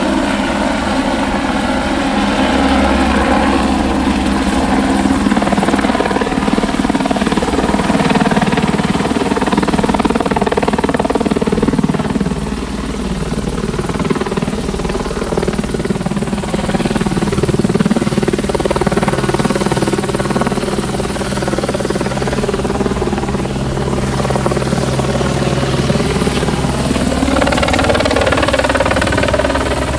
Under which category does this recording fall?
Soundscapes > Urban